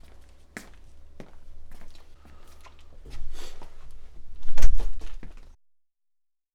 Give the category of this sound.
Sound effects > Objects / House appliances